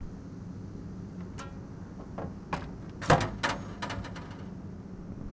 Sound effects > Objects / House appliances
Book drop at library 8312025
Dropping book off in metal book drop. I recorded this on zoom h1 essential.